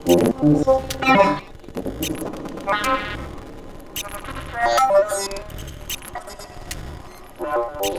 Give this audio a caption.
Sound effects > Experimental
This pack focuses on sound samples with synthesis-produced contents that seem to feature "human" voices in the noise. These sounds were arrived at "accidentally" (without any premeditated effort to emulate the human voice). This loop was created with help from Sonora Cinematic's incredible 'Harmonic Bloom' tool, which extracts harmonics from "noisy" source material. This process is another one which often results in chattering or "conversational" babble that approximates the human voice.
120bpm, apophenia, Harmonic-Bloom, harmonic-extractor, loop, pareidolia, shaped-noise, vocal